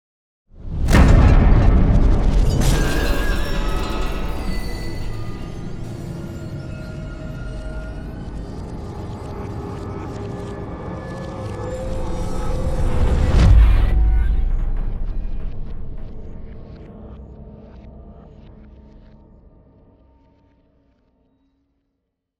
Sound effects > Other
Sound Design Elements SFX PS 078
reveal, game, epic, tension, riser, implosion, cinematic, video, transition, whoosh, bass, hit, sweep, impact, stinger, trailer, boom, effect, movement, sub, explosion, indent, deep, metal, industrial